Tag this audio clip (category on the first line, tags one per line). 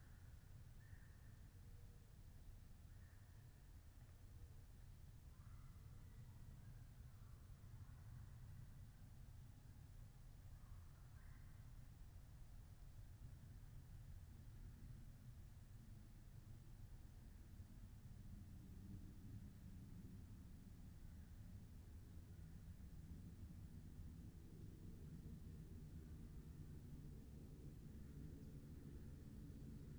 Soundscapes > Nature
field-recording
natural-soundscape
modified-soundscape
weather-data
data-to-sound
phenological-recording
Dendrophone
soundscape
nature
alice-holt-forest
raspberry-pi
sound-installation
artistic-intervention